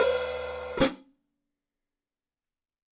Music > Solo instrument
Vintage Custom 14 inch Hi Hat-005
Hat, Metal, HiHat, Drums, Drum, Oneshot, Hats, Cymbals, Kit, Percussion, Perc